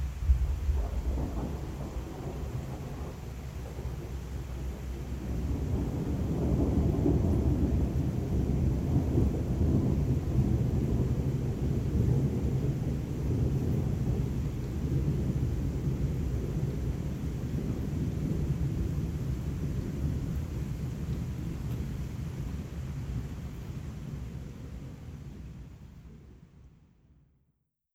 Sound effects > Natural elements and explosions
THUN-Samsung Galaxy Smartphone, MCU Lightning, Ripple, Distant, Thunder, Rumble Nicholas Judy TDC
Distant lightning ripples with thunder rumbles.